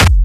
Instrument samples > Percussion
This sample's old name is ''BrazilFunk Kick 12 Fatty''.